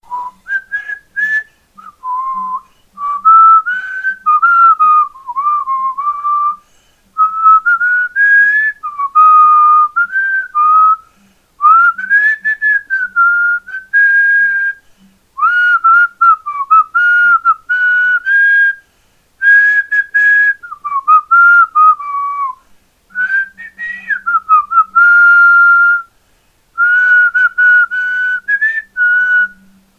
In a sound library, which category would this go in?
Sound effects > Human sounds and actions